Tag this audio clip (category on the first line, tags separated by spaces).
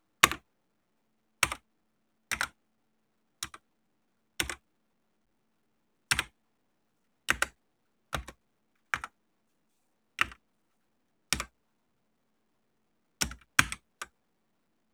Sound effects > Human sounds and actions
black computer desktop keyboard keys laptop PC typing